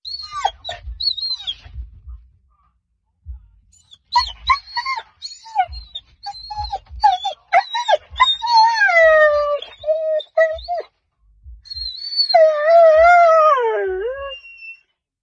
Sound effects > Animals
Impatient Whiny Dog
Malinois Belgian Shepherd dog impatiently whining in a parked car before exiting to go to the forest. Extracted from an iPhone 15 Pro video using Audacity, aggressive background music removal in RX (Spectral De-Noise), uploaded with permission.
high-pitched, yelping